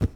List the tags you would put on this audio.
Sound effects > Objects / House appliances
bucket carry clang clatter cleaning container debris drop fill foley garden handle hollow household kitchen knock lid liquid metal object pail plastic pour scoop shake slam spill tip tool water

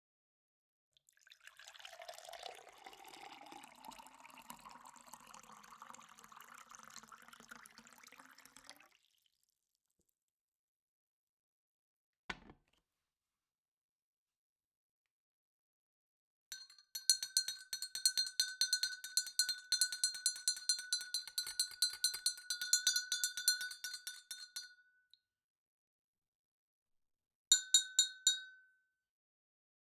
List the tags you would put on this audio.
Sound effects > Objects / House appliances
fill glass metal metallic pour sfx spoon stir water

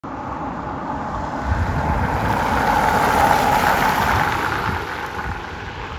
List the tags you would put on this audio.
Soundscapes > Urban
Car Drive-by field-recording

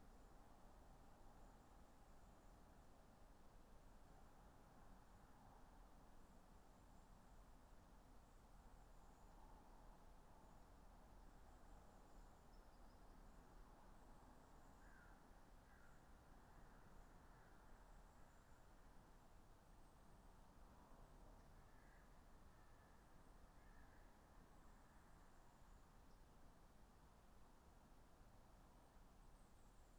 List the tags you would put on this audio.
Soundscapes > Nature
nature raspberry-pi phenological-recording sound-installation weather-data alice-holt-forest artistic-intervention modified-soundscape soundscape field-recording data-to-sound natural-soundscape Dendrophone

